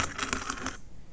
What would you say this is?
Sound effects > Other mechanisms, engines, machines
grinder wire brush foley-007
Foley, Workshop, Shop, Mechanical, Scrape, Household, Metallic, Brush, fx, Tools, sfx, Bristle, Woodshop, Tool, Brushing